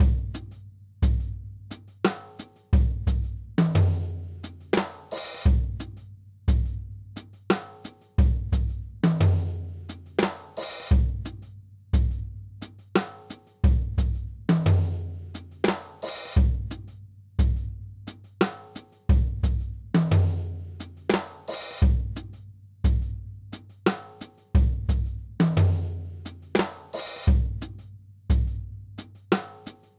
Music > Solo percussion
Basic Beat 88 bpm
toms, drumset, kick, hihat, music, drums, snare